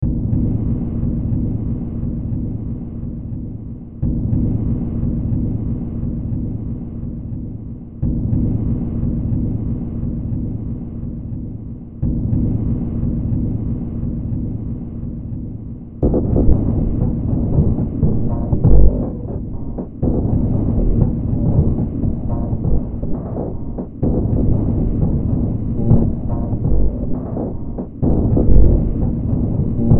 Music > Multiple instruments
Demo Track #3777 (Industraumatic)
Ambient, Cyberpunk, Games, Horror, Industrial, Noise, Sci-fi, Soundtrack, Underground